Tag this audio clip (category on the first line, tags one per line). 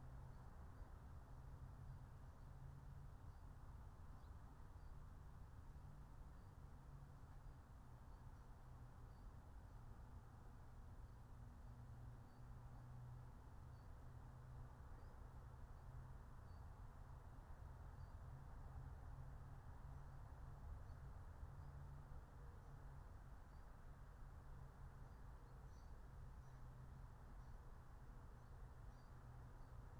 Soundscapes > Nature
field-recording
natural-soundscape
nature
phenological-recording
raspberry-pi
soundscape